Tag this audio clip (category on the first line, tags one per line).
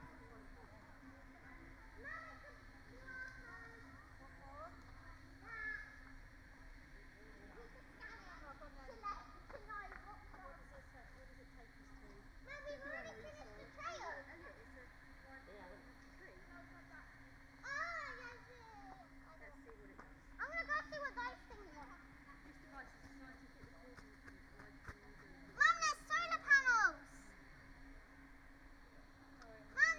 Soundscapes > Nature
field-recording
soundscape
raspberry-pi
natural-soundscape
Dendrophone
phenological-recording
modified-soundscape
nature
alice-holt-forest
artistic-intervention
sound-installation
data-to-sound
weather-data